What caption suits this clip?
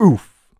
Speech > Solo speech
Hurt - Oof 3
dialogue, FR-AV2, Human, Hurt, Male, Man, Mid-20s, Neumann, NPC, oneshot, pain, singletake, Single-take, talk, Tascam, U67, Video-game, Vocal, voice, Voice-acting